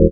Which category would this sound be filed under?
Instrument samples > Synths / Electronic